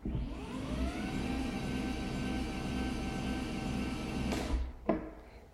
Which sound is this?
Sound effects > Other mechanisms, engines, machines
Hydraulics Moving (shorter)
machine, machinery